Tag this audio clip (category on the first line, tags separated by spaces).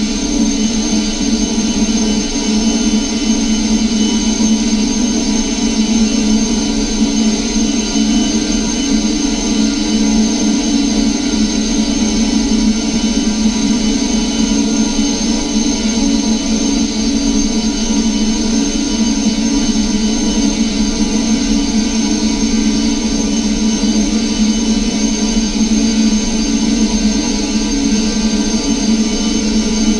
Sound effects > Experimental
ringing audacity